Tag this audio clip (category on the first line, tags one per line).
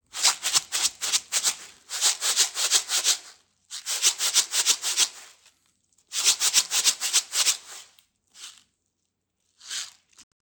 Sound effects > Human sounds and actions
rattling
pieces
sprinkles
shaking